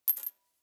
Sound effects > Objects / House appliances
Coin Foley 1
foley, change, coin, coins, sfx, perc, fx, jostle, percusion, tap, jingle